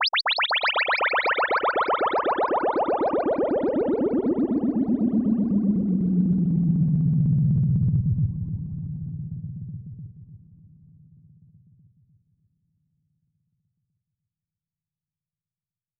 Sound effects > Electronic / Design
Echo-ey Modular Synth Bubbles
Created a simple modular synth patch that sounds like bubbles, added some delay and reverb to make the effect pop a bit more
bubbles, echo, effect, transition